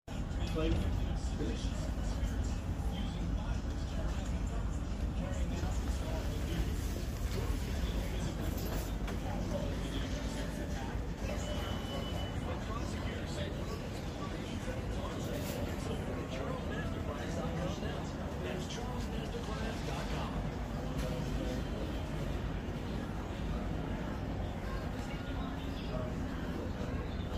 Soundscapes > Indoors
This soundscape captures the ambiance of a typical repair shop waiting room. Faint conversations mix with the low TV in background.